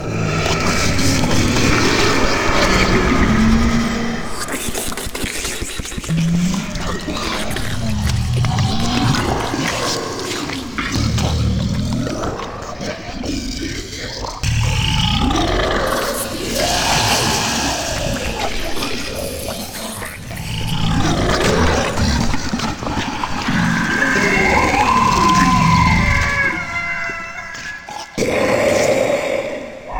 Sound effects > Experimental
Creature Monster Alien Vocal FX LONG
zombie, Monster, Alien, otherworldly, weird, snarl, grotesque, mouth, howl, devil, fx, dripping, demon, bite, Sfx, gross, growl, Creature